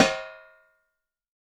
Sound effects > Objects / House appliances
Round baking tray old 1
A single-hit of an old, metal baking tray with a drum stick. Recorded on a Shure SM57.
hit, metal, metallic, percussion, percussive, sngle-hit